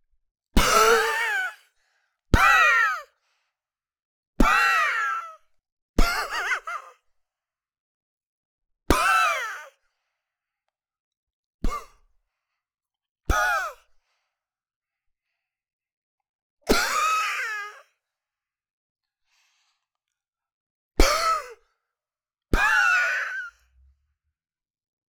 Sound effects > Other
Cartoon - Dry Puff of Dust or Empty Container
Audio of myself doing exaggerated dehydration/dry sounds like in old cartoons when someone opens a container and only a puff of dust or smoke comes out. Useful for emphasizing something being dry or dehydrated, like in a desert setting. This is the sound of someone crawling through the sand opening up their canteen only to find nothing left.
Dry, Cartoon, empty, canteen, desert, pipe, breath, dehydration, bottle, smoke, spigot, thirsty